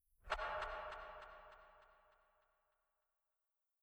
Sound effects > Other mechanisms, engines, machines
tickC echo slowed reverb
One of multiple variations. Meant to work as a slow down/ rewind effect.
clacking, clock, tick-tock, echo, time, ticks, seconds, minute, down, ticking, hand, reverb, slow, rewind